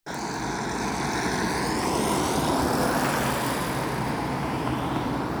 Soundscapes > Urban
voice 9 14-11-2025 car
Car, CarInTampere, vehicle